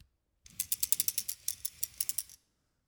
Sound effects > Objects / House appliances
250726 - Vacuum cleaner - Philips PowerPro 7000 series - Telescopic broom extension
FR-AV2, Powerpro-7000-series, Shotgun-microphone, Sennheiser, Single-mic-mono, Hypercardioid, 7000, MKE-600, aspirateur, Vacum, vacuum, cleaner, MKE600, vacuum-cleaner, Powerpro, Shotgun-mic, Tascam